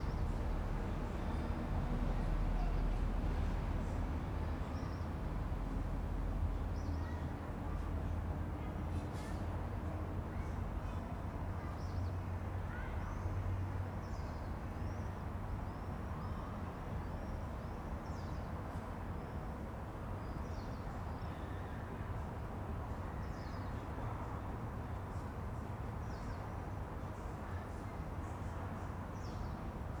Soundscapes > Urban
Peaceful Summer Nightfall in a Quiet St Petersburg Neighborhood
Запись сделана рядом с 539 школой СПб, 25.07.2025 на Zoom IQ7 Residential area, recorded on July 25th, 2025 using ZOOM IQ7
Saint-Petersburg, Summer, Evening, Street, Russia, City, Kids, Traffic, Dogs